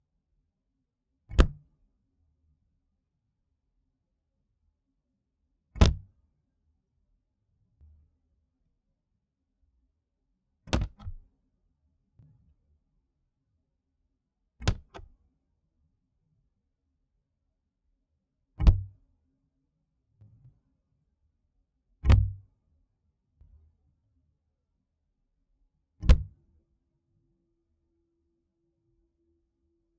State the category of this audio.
Sound effects > Objects / House appliances